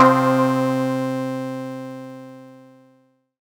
Instrument samples > Percussion
This is my first sample for this site. I took a standard cowbell and processed its sound, adding a tail using a simple synthesizer. I hope this sample is useful to you.